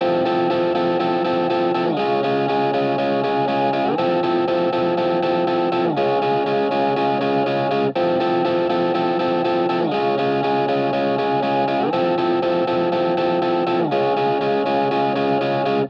Music > Solo instrument
Guitar loops 124 06 verison 06 120.8 bpm
Otherwise, it is well usable up to 4/4 120.8 bpm.
bpm, electric, electricguitar, free, guitar, loop, music, reverb, samples, simple, simplesamples